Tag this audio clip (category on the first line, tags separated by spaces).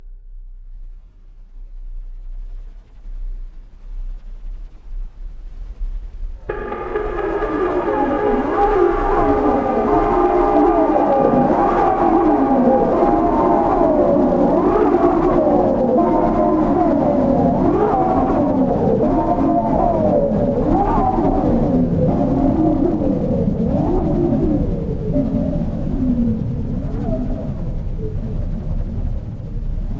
Soundscapes > Synthetic / Artificial
rumble bassy shimmering dark shimmer glitch howl experimental atmosphere texture roar ambient ambience shifting fx slow synthetic glitchy long wind evolving sfx alien effect bass landscape low drone